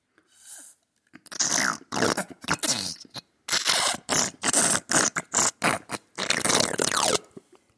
Sound effects > Human sounds and actions
Jelly Sucked Up a Metal Straw (Long)
Cola-flavoured jelly sucked up a metal straw, making a bizarre sound.
gelatin, jello, jelly, metal, pd, pudding, slime